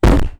Sound effects > Other
Impact sound effect. Great for fight scenes, and it sounds like a very strong punch. Recorded with Audacity by hitting the microphone, lowering the volume of the original recording, and applying a fade out.
fight, fighting, hit, impact, power, thud